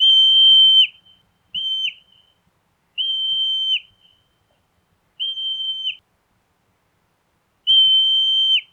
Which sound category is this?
Soundscapes > Nature